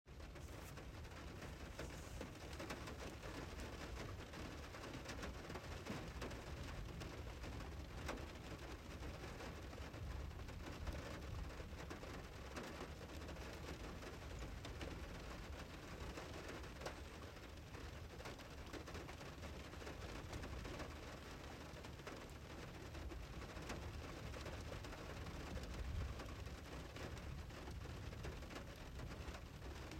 Soundscapes > Nature
rain in car (2)
Was in my car after work and recorded the sounds of the rain hitting from the inside
rain, car, thunderstorm, field-recording, rainstorm, nature, storm